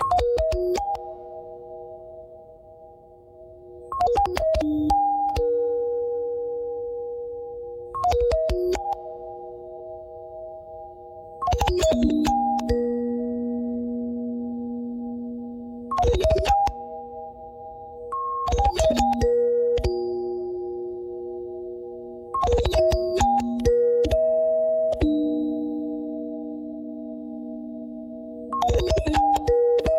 Music > Multiple instruments
Experiments on atonal melodies that can be used as background textures. AI Software: Suno Prompt: experimental, magnetic, atonal, bouncing, ping-pong, organic, low tones, bells, Mouth Blip Blops, echo, delays, reverb, weird, surprising